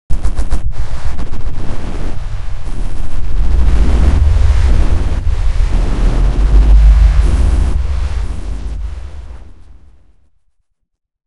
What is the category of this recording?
Sound effects > Electronic / Design